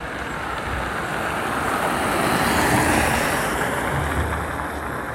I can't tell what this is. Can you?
Sound effects > Vehicles
car sunny 03
engine, car, vehicle